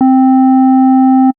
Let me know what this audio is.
Instrument samples > Synths / Electronic
04. FM-X ODD1 SKIRT1 C3root
MODX, Montage